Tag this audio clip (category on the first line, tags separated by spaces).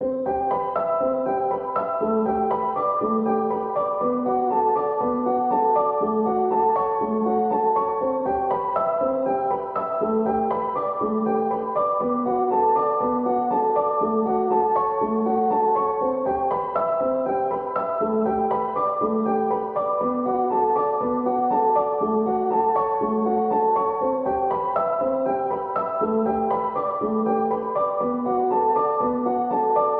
Music > Solo instrument
120 120bpm free loop music piano pianomusic reverb samples simple simplesamples